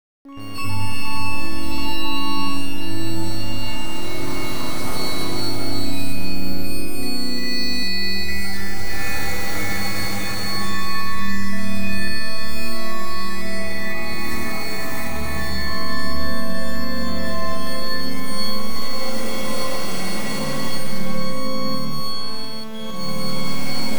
Soundscapes > Synthetic / Artificial
Trickle Down The Grain 10
These are my first experiments with a granulator. I believe there will be more volumes. Sounds are suitable for cinematic, horror, sci-fi film and video game design.
experimental, soundscapes, samples, granulator, free, glitch, packs, sound-effects, noise, electronic, sfx, sample